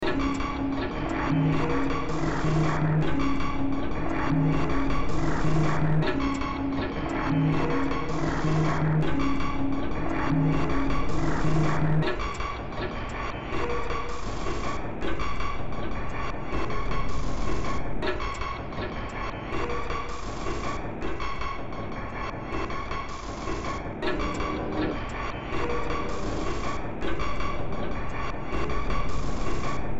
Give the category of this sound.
Music > Multiple instruments